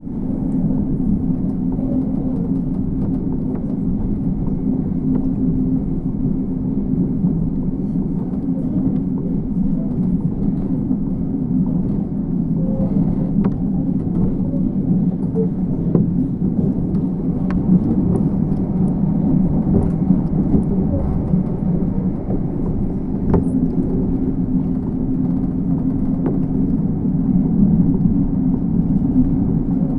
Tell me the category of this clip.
Soundscapes > Urban